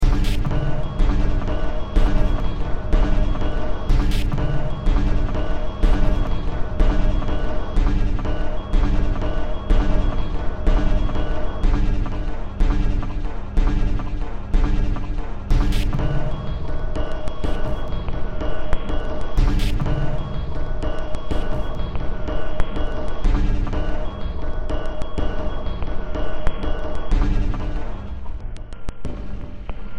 Music > Multiple instruments
Demo Track #3083 (Industraumatic)
Underground, Industrial, Ambient, Soundtrack, Horror, Sci-fi, Noise, Cyberpunk, Games